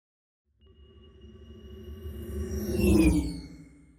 Sound effects > Electronic / Design
A Far SpaceCraft Passing from L to R, Designed with a synth